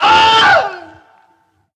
Sound effects > Human sounds and actions
Wilhelm Scream Alternative 5
willhelmscream, wilhelm, man, fall, wilhelmscream, shouting, willhelm, male, willhelm-scream, pain, legend, legendary, falling, screaming, meme, death, killed, shout, famous, Wilhelm-Scream, scream